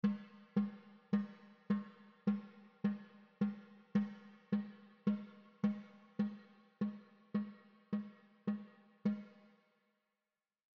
Music > Solo percussion
snare Processed - quick oneshots round robins - 14 by 6.5 inch Brass Ludwig
drumkit, sfx, snares, percussion, fx, rim, oneshot, acoustic, brass, crack, hit, rimshot, snareroll, beat, drum, ludwig, perc, drums, rimshots, roll, snaredrum, processed, flam, reverb, snare, realdrums, kit, hits, realdrum